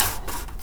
Sound effects > Other mechanisms, engines, machines
metal shop foley -143
bam, bang, boom, bop, crackle, foley, fx, knock, little, metal, oneshot, perc, percussion, pop, rustle, sfx, shop, sound, strike, thud, tink, tools, wood